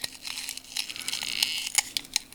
Sound effects > Natural elements and explosions
Ice crackles under pressure
The breif sound of ice crackling under pressure